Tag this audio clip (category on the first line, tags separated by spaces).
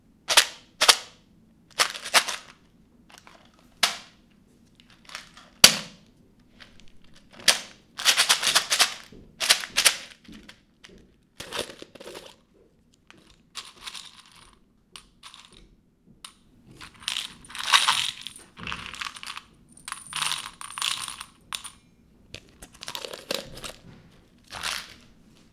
Sound effects > Objects / House appliances
thumb-tacks rattling tub pins plastic-tub rattle shake thumbtacks shaking drawing-pins stationery small-items